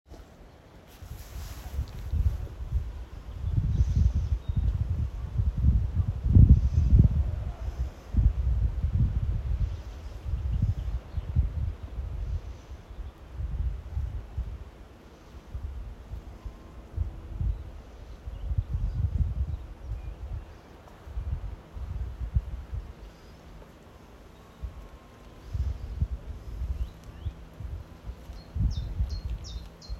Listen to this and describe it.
Soundscapes > Nature
This was recorded in Cornwall on an iPhone 14, Birds, wind and forest atmosphere.